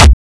Instrument samples > Percussion
BrazilFunk Kick 6
Plugin used: Waveshaper, Zl EQ. Let me teach you how to make this sound, its making process maybe like making a hardstyle PVC kick: First, choose a kick from flstudio sample pack so-soly, input it to channel 1. Second, insert a Waveshaper in channel, huge amount out put. Third, insert a pre-ZL EQ above Waveshaper, cut low freq till it sounds crunchy. Fourth, use Edison to record it, drag it out and cut all bass parts of it, just leave the crunchy part only. Fifth, choose another punchy kick from flstudio original pack, then cross fade its transient part, but leave the puncy part a little bit, layer them. Finally, just choose another punch kick as a transient for them, cut bass, set it alongside the crunchy kick's head.
Distorted, BrazilFunk, Kick